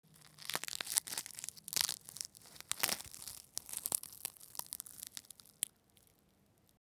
Sound effects > Objects / House appliances
Crunching leaf 01
Stereo recording of small leaf being squished by hand
leaf, plant, crunch, flower